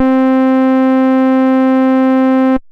Instrument samples > Synths / Electronic
02. FM-X ALL 1 SKIRT 5 C3root
FM-X, MODX, Montage, Yamaha